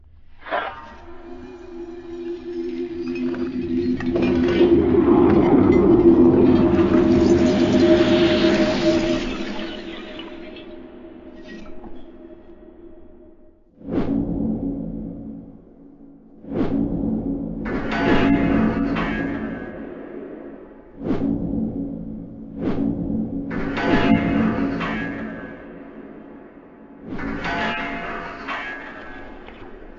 Sound effects > Other
dark metallic hitting sounds with atmospheric background/echo/reverb
Dark metallic objects hitting/impacting each other, or hitting wall with echo/reverb.